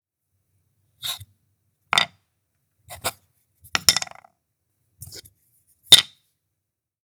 Sound effects > Objects / House appliances
KITCH Cinematis CutlerySpoonWood PickUpPutDown DeskWood Fast 02 Freebie
A wooden spoon striking a wooden table fast. This is one of several freebie sounds from my Random Foley | Vol. 3 | Cutlery pack. This new release is all about authentic cutlery sounds - clinks and taps on porcelain, wood, and ceramic.
Cutlery, effects, Foley, Freebie, handling, PostProduction, recording, SFX, Sound, spoon, wood